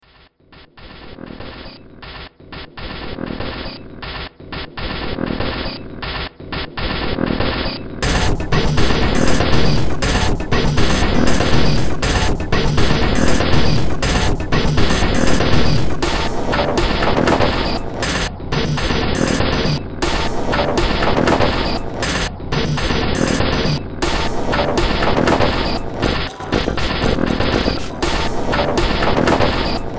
Music > Multiple instruments
Demo Track #3467 (Industraumatic)
Ambient, Cyberpunk, Games, Horror, Industrial, Noise, Sci-fi, Soundtrack, Underground